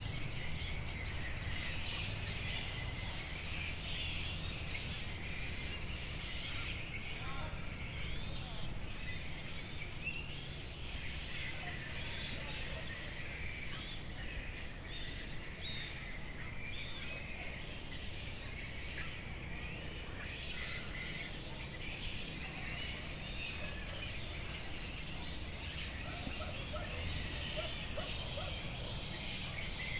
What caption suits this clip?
Soundscapes > Urban
Hundreds of flying foxes (acerodon jubatus) at Puerto Galera. (take 3) I made this recording at dusk, in Puerto Galera (Oriental Mindoro, Philippines) while hundreds (maybe thousands ?) flying foxes were screaming and started to fly. In the background, one can hear the noise and hum from the town. Recorded in July 2025 with a Zoom H5studio (built-in XY microphones). Fade in/out applied in Audacity.